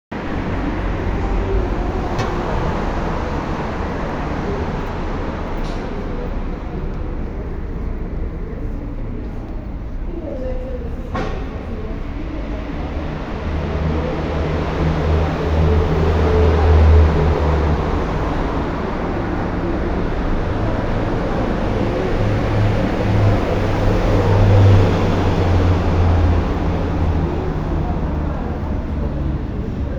Soundscapes > Urban
20250513 0928 viaduct phone microphone
atmophere, field, recording